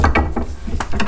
Sound effects > Other mechanisms, engines, machines
metal shop foley -014
bam, bop, crackle, perc, rustle, strike, thud, wood